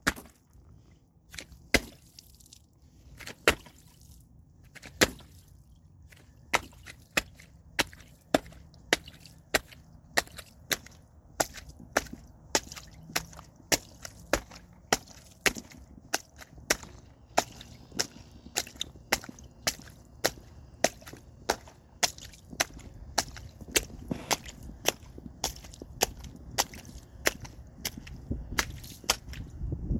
Sound effects > Human sounds and actions
FEETHmn-Samsung Galaxy Smartphone, CU Cold Puddle, Splashes Nicholas Judy TDC
Footsteps on a cold puddle with splashing.